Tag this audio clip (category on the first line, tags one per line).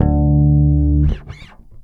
Instrument samples > String
loop
loops
fx
slide
rock
mellow
electric
charvel
blues
pluck
funk
oneshots
riffs
plucked
bass